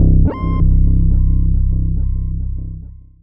Instrument samples > Synths / Electronic
CVLT BASS 33
sub, subbass